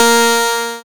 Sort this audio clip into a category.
Instrument samples > Percussion